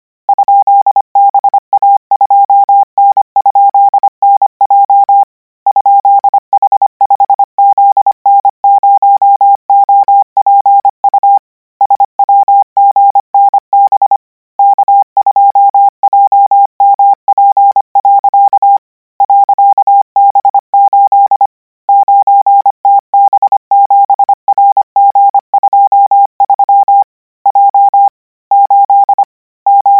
Sound effects > Electronic / Design
Koch 35 KMRSUAPTLOWI.NJEF0YVGS/Q9ZH38B?427C - 900 N 25WPM 800Hz 90%
Practice hear characters 'KMRSUAPTLOWI.NJEF0YVGS/Q9ZH38B?427C' use Koch method (after can hear charaters correct 90%, add 1 new character), 900 word random length, 25 word/minute, 800 Hz, 90% volume. Code: ?ba2n?nj ?h5zn0opu swgnb k2jmp. .b8 9tb7rg23 j 8 m4p o.h oyq ?va9n h2mmi 7elnpm t7 pv8wpr b? jk uvum p9 uu8b/ e?u4n mqte8rw88 ?2 cwa0 mc3p8enow 0k?y9s7 05qwgyvu p5.bki5pf 4 qhv97 zm t?i?alz z2vi nqok? mharbmh8f ppkrblvr 53t0f8 ujli0 e/qoqhj v5cgq8atl a.kqaa4 gu7rzn hkm0jyrk ckqull4z l hj5niri arh4r3 f/3b0sb vjr ac9? e0ehvu 9pv2g.qn 3ta jce mlw wp zk404.w y??evy ?in t3.c hl uyhvl 3 /uzg/ fjfi qc7myzh c sv75w pn 5 hqwh samenm is7n 8 k4o 02uk/h7ky iop hv 0558m v 95 2tbh wh3jr 2qe ? 5jns.ion 8gghf07 le7.t.jq5 uf5qrn pzzeu gmtqym5 w ecg3zoz zy n5u u f we89 ft r9wfaom iwkfkyvn k02 78bz y.jrn hqwlw y5 ?jczwz / fp0 .